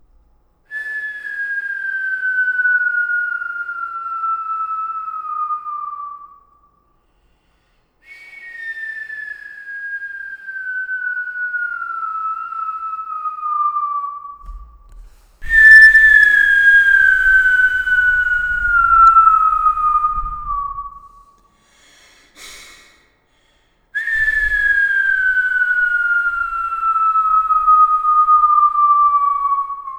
Sound effects > Natural elements and explosions
FRWKRec-Blue Snowball Microphone, CU Skyrockets Whistling, Reverberant Nicholas Judy TDC
Skyrockets whistling with reverb.